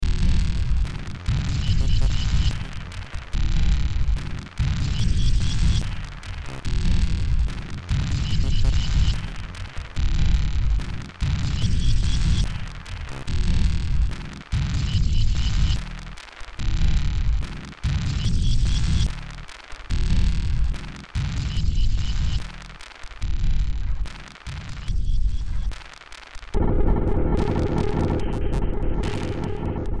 Music > Multiple instruments
Demo Track #3919 (Industraumatic)
Ambient, Games, Cyberpunk, Horror, Underground, Industrial, Sci-fi, Noise, Soundtrack